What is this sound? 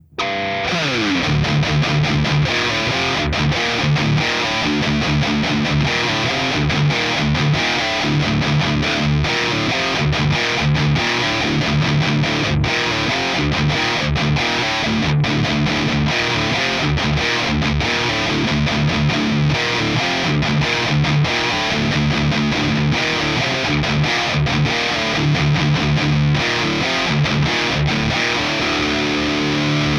Music > Solo instrument
Heavy Metal style riffs made by me, using a custom made Les Paul style guitar with a Gibson 500T humbucker. 5150 TS profile used via a Kemper Profiler Amp, recorded on Reaper software.